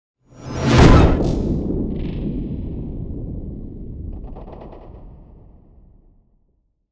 Sound effects > Other
Sound Design Elements Impact SFX PS 098
A powerful and cinematic sound design impact, perfect for trailers, transitions, and dramatic moments. Effects recorded from the field.
blunt, cinematic, collision, crash, design, force, game, hard, impact, percussive, power, rumble, sfx, sharp, shockwave, sound, strike, thud, transient